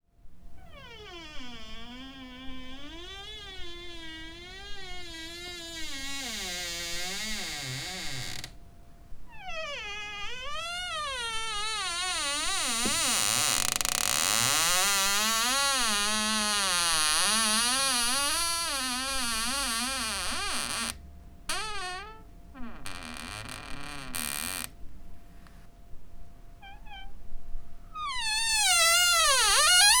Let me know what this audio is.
Sound effects > Objects / House appliances
I recorded a noisey squeaking wardrobe door hinge using a ZOOM H4 digital recorder.